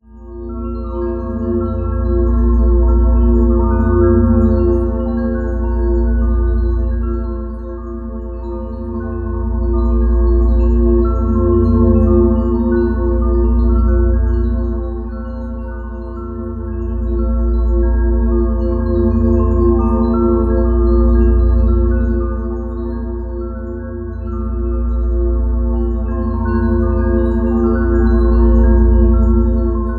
Sound effects > Electronic / Design
Heavenly Gate (Ambience)
divine-aura, heal-magic, meditation, mystic-gate-resonance, white-magic-aura